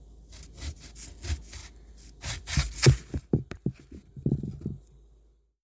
Sound effects > Objects / House appliances
FOODCook-Samsung Galaxy Smartphone, CU Lemon, Slice Nicholas Judy TDC
A lemon being sliced.